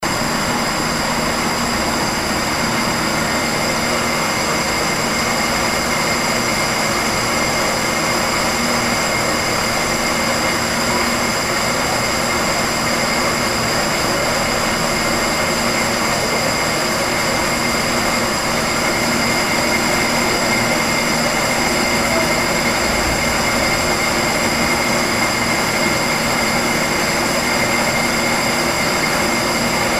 Sound effects > Other mechanisms, engines, machines
Cotton Spinner machine
The deafening sound of a cotton spinning machine dating from the 1880s. Recorded on an Edirol R-09 field recorder in August 2010 at the Manchester Museum of Science and Industry (MOSI).
textile-manufacture, mosi, machine, mills, cotton, victorian, spindle, whine, weaving